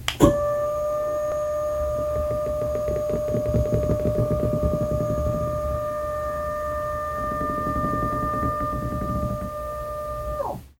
Sound effects > Objects / House appliances
A bed servo motor lowering with creaks.